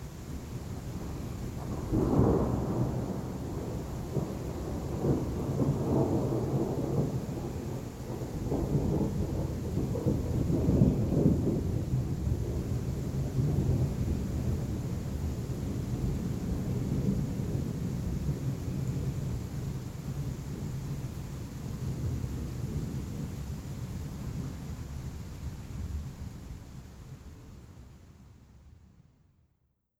Sound effects > Natural elements and explosions
THUN-Samsung Galaxy Smartphone, CU Thunder, Ripple, Boom Nicholas Judy TDC
A thunder ripple and boom.